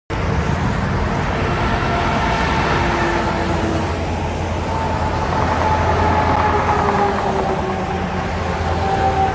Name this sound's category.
Sound effects > Vehicles